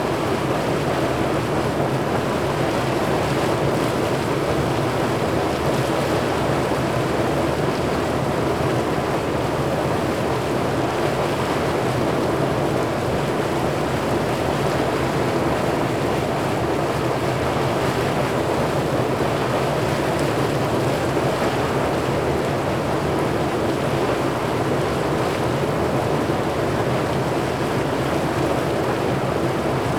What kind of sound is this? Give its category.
Soundscapes > Nature